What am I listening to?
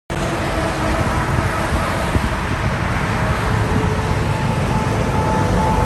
Sound effects > Vehicles
Sun Dec 21 2025 (3)
car, highway, road